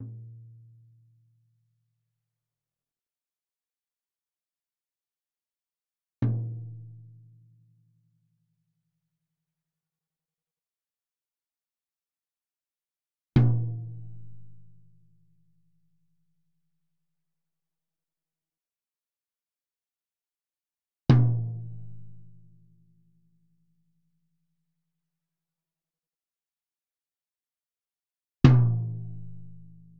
Music > Solo percussion
med low tom-velocity build sequence 2 12 inch Sonor Force 3007 Maple Rack
wood, real, perc, maple, beat, med-tom, loop, roll, realdrum, recording, oneshot, flam, kit, Medium-Tom, percussion, drumkit, drums, drum, tomdrum, Tom, acoustic, toms, quality